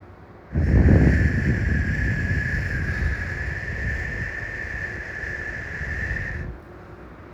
Sound effects > Natural elements and explosions
Wind blowing
Noise, Wind